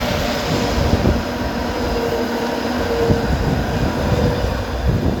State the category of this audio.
Sound effects > Vehicles